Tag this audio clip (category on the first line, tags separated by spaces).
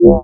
Instrument samples > Synths / Electronic
additive-synthesis bass fm-synthesis